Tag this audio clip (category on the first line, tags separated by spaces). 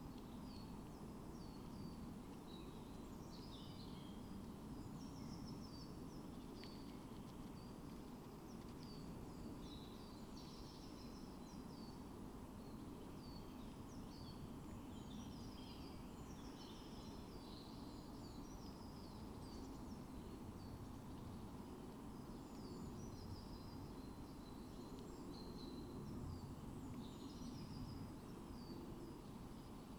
Soundscapes > Nature
modified-soundscape Dendrophone phenological-recording field-recording sound-installation